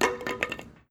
Sound effects > Objects / House appliances
A wooden board drop.